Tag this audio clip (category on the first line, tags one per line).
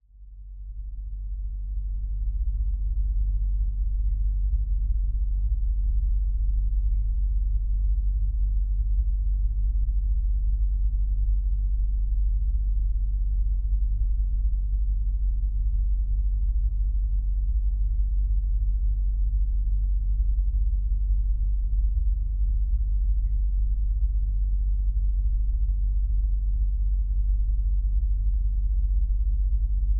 Sound effects > Electronic / Design
ambient
bass
design
drone
fx
geofon